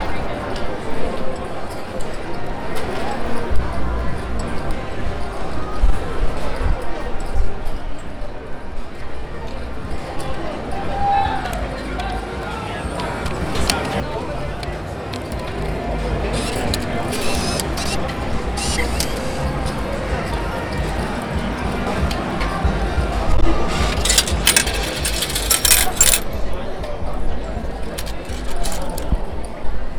Soundscapes > Urban
Las Vegas Casino Slot Machines 2
Typical Las Vegas Casino Slot Machines sound.
slot-machine, slots